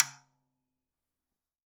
Music > Solo instrument
Rim Hit Perc Oneshot-001
Crash, Custom, Cymbal, Cymbals, Drum, Drums, FX, GONG, Hat, Kit, Metal, Oneshot, Paiste, Perc, Percussion, Ride, Sabian